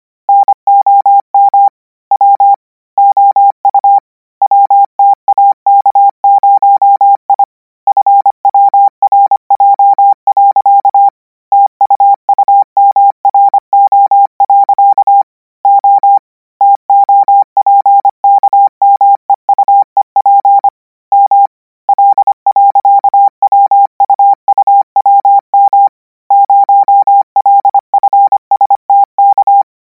Sound effects > Electronic / Design
Koch 18 KMRSUAPTLOWI.NJEF0 - 560 N 25WPM 800Hz 90%
Practice hear characters 'KMRSUAPTLOWI.NJEF0' use Koch method (after can hear charaters correct 90%, add 1 new character), 560 word random length, 25 word/minute, 800 Hz, 90% volume. Code: nom w ou wtak0i fwrj. tuumro. o topkmeuep m l.wuuwm 0lfstk mm t ojuwopp ftmu0wo rwe0 nsaniu.i mrps ew0 lp. su pa m tjawt ekltmonur .00rswnp. w0uo oat w ewnro n.r pmmt irp .jsjaa wwpknmi srlfjw0 okli w nrif0fm poopuw wikkt.wun ssw.iatjf njrf . tfeuop one 0 0r lntiks uj pwafe ew pfen w oro j rulmaks s l ppjsflt srui. .sm fswpujatj eu.mlluaj kfi osj pnwr ro0f.u k0 .ksru jnu.ttr ms jn0wfpo kjak kw.m. lt..m00m k ujkraj jotljar.f ilw0er emof w iurn f utt m. iw ameuj ji0pwpj 0kn l.n 0rr0 nwa0nu fkw. tfrn nw os0 ji f eiplj skkmkl. el wmps eu j k. nwlkkru rr mi olm 0rwkw um0ami ns n w0 wm i im wa infsfrae plt0wj. p l olpmmtk .faa pseiks tleerlpt eulswlskl wtkfsu0 f mjatokw0 00msaan liusu mk. 0ime.n k kwraom waosfee kmi muafeujtk tsne kpaujw kpon w ktiu o0es ef.kow tjlo fso nu.sf.
codigo, morse